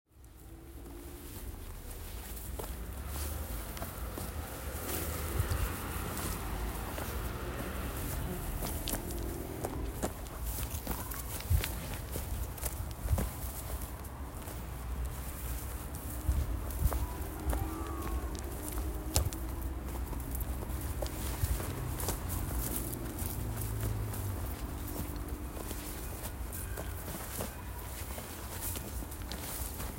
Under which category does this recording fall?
Sound effects > Natural elements and explosions